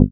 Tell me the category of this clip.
Instrument samples > Synths / Electronic